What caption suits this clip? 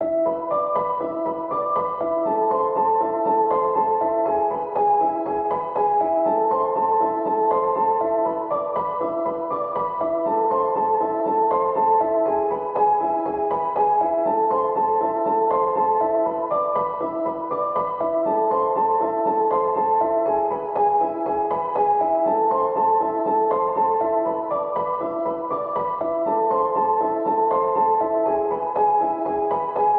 Music > Solo instrument
Piano loops 098 efect 4 octave long loop 120 bpm
120, 120bpm, free, loop, music, piano, pianomusic, reverb, samples, simple, simplesamples